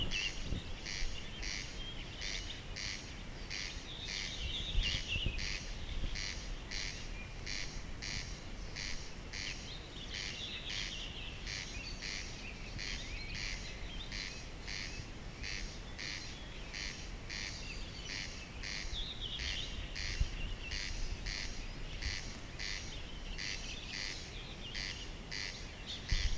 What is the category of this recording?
Soundscapes > Nature